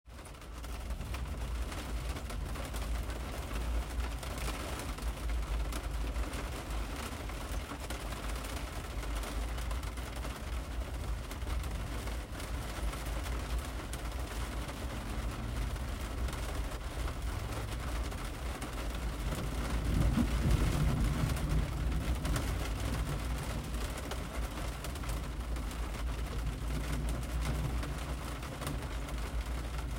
Sound effects > Natural elements and explosions
Medium Rain on Jeep Roof - Interior 1
Medium rain on roof of Jeep Wrangler. Interior recording.
rain, raindrops, raining, vehicle, weather